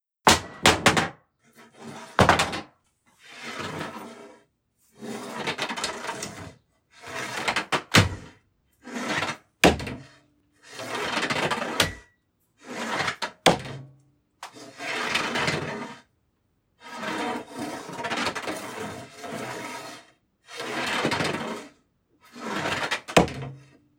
Sound effects > Other
Broom & stick - Havoc Bazaar
A mess is moved, pulled, and thrown roughly across a wooden floor. It includes various textures: broom falling and sticks being pulled in a chaotic way. I needed these sound effects to create chaos inside a ship. This one focuses on sticks, but you'll also find baskets in another audio, wood and furniture, metalic elements, cardboard boxes, and even barrels. * No background noise. * No reverb nor echo. * Clean sound, close range. Recorded with Iphone or Thomann micro t.bone SC 420.
throw, stock, jumble, mess, fall, tragedy, cargo, broom, flooring, havoc, shatter, attic, pull, wedge, loads, clutter, bazaar, shipwreck, tragic, wreakage, chaos, stick, roll, percussive, disaster, catastrophe, devastation, muddle, disorder, mayhem